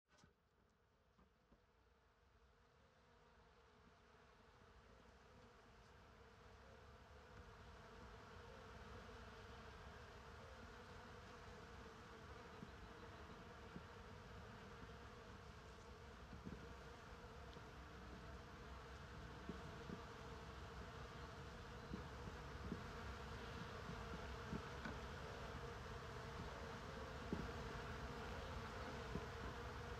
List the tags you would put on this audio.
Soundscapes > Nature
pollinating bees farm

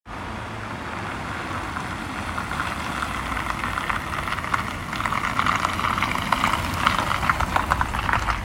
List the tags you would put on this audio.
Sound effects > Vehicles
vehicle rain tampere

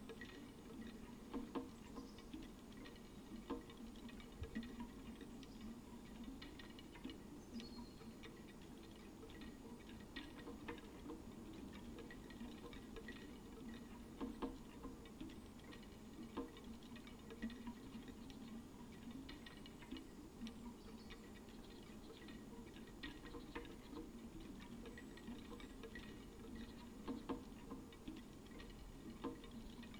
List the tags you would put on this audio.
Soundscapes > Nature
sound-installation phenological-recording modified-soundscape nature field-recording alice-holt-forest soundscape natural-soundscape artistic-intervention raspberry-pi Dendrophone data-to-sound weather-data

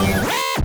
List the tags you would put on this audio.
Sound effects > Electronic / Design
one-shot hard digital glitch machine pitched

